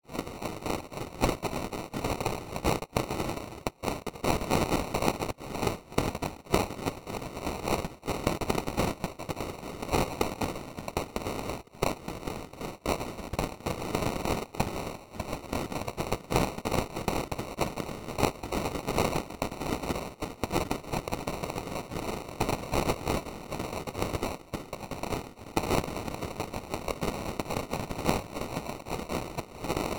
Sound effects > Objects / House appliances
Error TV 5 Noise Crushed
Error, Radio